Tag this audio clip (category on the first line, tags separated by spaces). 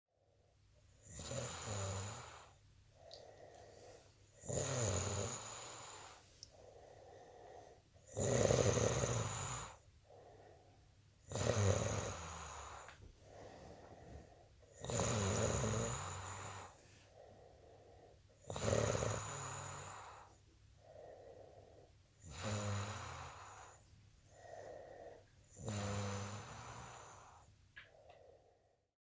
Sound effects > Human sounds and actions
nasal snoring rest male nap man snore bedroom sleeping sleep night